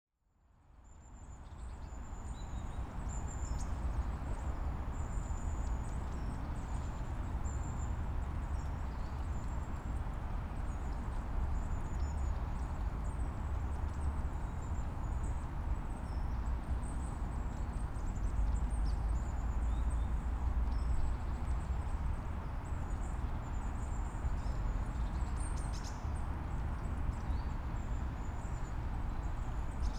Soundscapes > Other
A morning recording from Hopwas Woods, Staffordshire. Zoom F3. Stereo. EM272Z1 Mics.
forest, nature, birds, field-recording, ambience, woods, traffic, woodland, distant-gun-fire